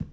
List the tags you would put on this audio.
Sound effects > Objects / House appliances
spill
hollow
object
bucket
garden
knock
clatter
plastic
pail
kitchen
container
household
tip
clang
shake
handle
debris
fill
liquid
lid
scoop
tool
water
pour
carry
cleaning
slam
drop
metal
foley

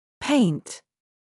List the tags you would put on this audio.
Speech > Solo speech
english
pronunciation
voice
word

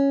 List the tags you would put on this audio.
Instrument samples > String
stratocaster
arpeggio
cheap
tone
guitar
design
sound

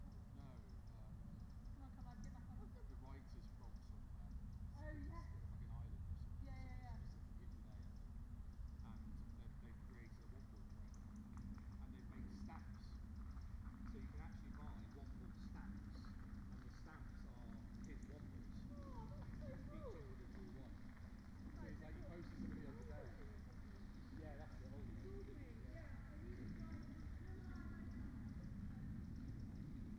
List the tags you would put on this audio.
Soundscapes > Nature
natural-soundscape
field-recording

